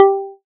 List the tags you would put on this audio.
Instrument samples > Synths / Electronic
pluck fm-synthesis

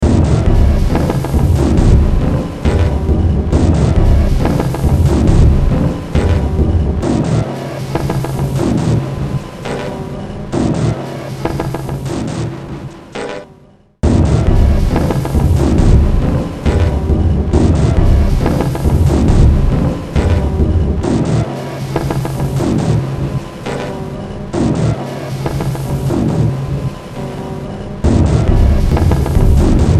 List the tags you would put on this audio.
Music > Multiple instruments
Sci-fi Industrial Soundtrack Ambient Horror Underground Games Cyberpunk Noise

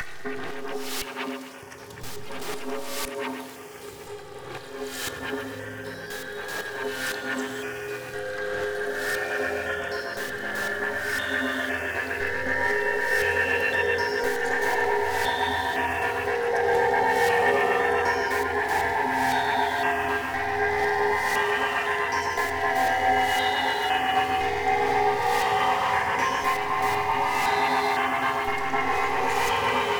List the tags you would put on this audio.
Soundscapes > Synthetic / Artificial
glitchy shifting alien howl experimental texture sfx ambience drone long shimmering dark bassy wind fx shimmer evolving synthetic slow landscape rumble ambient glitch bass effect low atmosphere roar

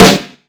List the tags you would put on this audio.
Instrument samples > Percussion
active-snare chorusnare death-metal deathsnare doom doom-metal doomsnare drum DW electrosnare grating Gretsch hoarse Ludwig main-snare mainsnare Mapex metal Pearl percussion robosnare robotic-snare rock snare snared-drum snareflang strike thrash-metal timpano Yamaha

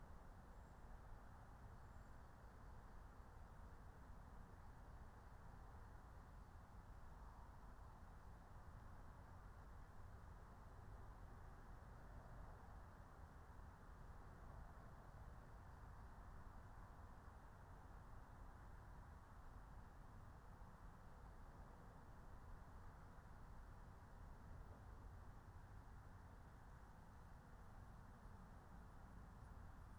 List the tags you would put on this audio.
Soundscapes > Nature
alice-holt-forest natural-soundscape meadow raspberry-pi nature phenological-recording field-recording soundscape

